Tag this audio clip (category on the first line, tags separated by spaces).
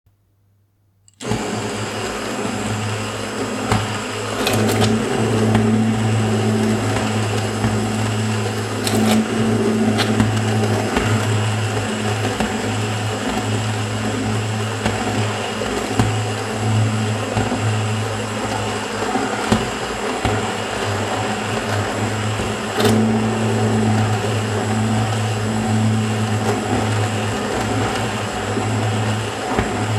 Sound effects > Objects / House appliances
sound appliance vegetables mixing food tomato stick sauce whirring splashing blending gurgling buzzing cooking blender preparation